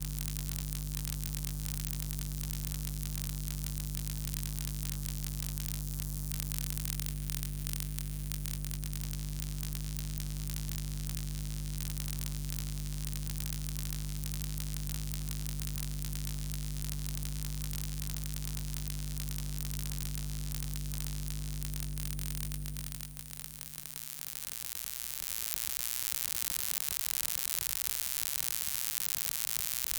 Sound effects > Objects / House appliances
Electromagnetic Field Recording of USB Charger
Electromagnetic field recording of a USB Charger. #0:00 recording from the side #0:23 recording from the front Electromagnetic Field Capture: Electrovision Telephone Pickup Coil AR71814 Audio Recorder: Zoom H1essential
charger, coil, electric, electrical, electromagnetic, field, field-recording, magnetic, noise, pick-up, pickup, usb